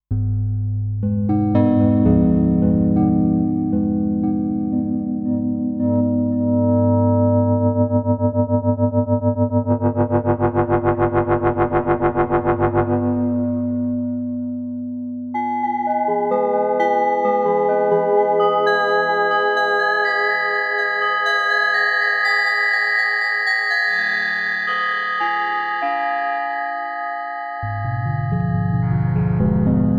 Music > Solo instrument
Soma Terra Ambient, Relax and Meditation #007 Doubtful Evening
This is a recording which I did with the Soma Terra. It was a long day and after work my head was full of thoughts ..and this is the dump of my head inside the Soma Terra I guess :) Recorder: Tascam Portacapture x6.
dreamscape, relax, soundscape, ambient, bell, meditation, relaxing, soma-terra